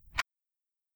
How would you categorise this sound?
Sound effects > Other mechanisms, engines, machines